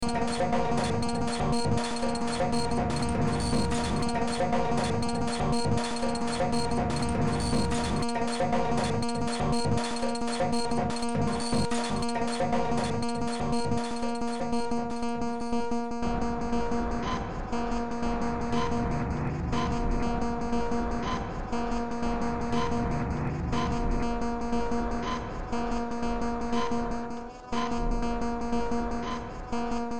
Music > Multiple instruments
Demo Track #3177 (Industraumatic)
Sci-fi, Industrial, Noise, Cyberpunk, Underground, Games, Ambient, Soundtrack, Horror